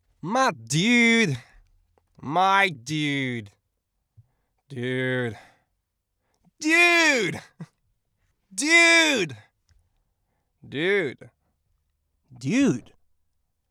Speech > Solo speech
Surfer dude - Dude
Subject : Recording my friend going by OMAT in his van, for a Surfer like voice pack. Saying dude. "Dude" Date YMD : 2025 August 06 Location : At Vue de tout albi in a van, Albi 81000 Tarn Occitanie France. Shure SM57 with a A2WS windshield. Weather : Sunny and hot, a little windy. Processing : Trimmed, some gain adjustment, tried not to mess too much with it recording to recording. Done inn Audacity. Some fade in/out if a oneshot.
Tascam, FR-AV2, Voice-acting, Adult, Male, France, SM57, Mono, RAW, 2025, English-language, August, A2WS, dude, Single-mic-mono, Surfer, 20s, VA, mid-20s, Cardioid, In-vehicle